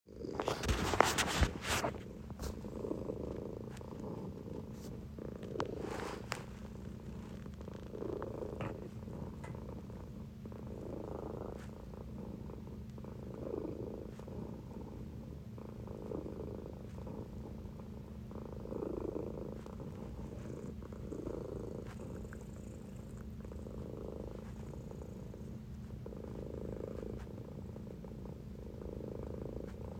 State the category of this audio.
Soundscapes > Other